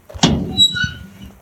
Sound effects > Objects / House appliances
Metal hatch opening. Recorded with my phone.
open, metal, squeak, opening, hatch, squeek